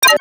Sound effects > Electronic / Design
Random UI Sounds 4
All samples used from FL studio original sample pack, I was tried to make a hardstyle rumble, but failed, I put it into vocodex because I was boring, then I got this sound.
UI, Game, Select, Click